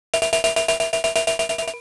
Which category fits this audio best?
Sound effects > Other